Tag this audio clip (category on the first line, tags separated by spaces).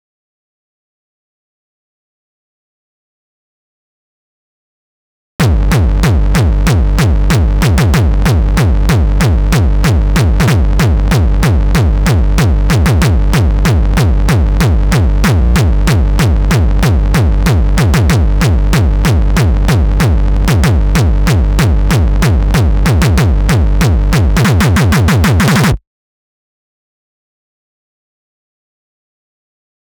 Instrument samples > Percussion
Distorted Drum Bass Kick